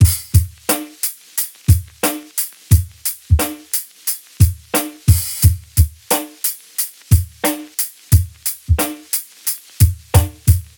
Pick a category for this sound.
Music > Solo percussion